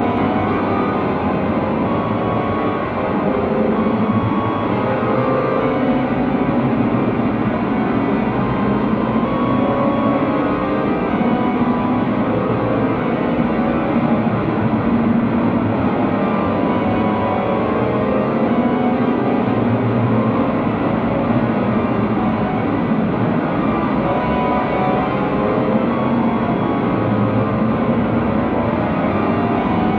Music > Solo instrument
atmo semihorror 13 sem 11 sem 130 BPM opus 1b AutoPan low-pitched
Autopanned cacophony based on the 13 semitonal interval and the 11 semitonal interval.
cacophonous, creepy, discordant, dissonant, evil, fear, ghost, harsh, horror, jarring, malevolent, nasty, scary, semidisharmonic, semidissonant, semievil, semihorror, semiterror, vile, wicked